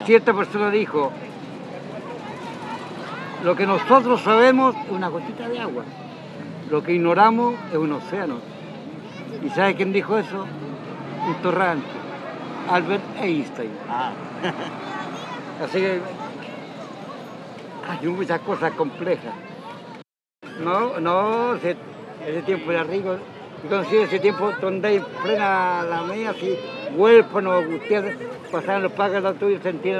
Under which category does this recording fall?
Speech > Solo speech